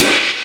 Instrument samples > Percussion
crash 20'' re-Sabian Vault Artisan 2
a bassized (low-pitched) Sabian crash I like it for music use. Remind me to use it!
20-inch, bassized, bass-splash, crash, cymbal, cymbals, drums, DW, low-pitched, Meinl, Paiste, Sabian, Zildjian, Zultan